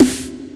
Instrument samples > Synths / Electronic
TR-606-mod-sn OneShot 03
606, Analog, Bass, Drum, DrumMachine, Electronic, Kit, Mod, Modified, music, Snare, SnareDrum, Synth, Vintage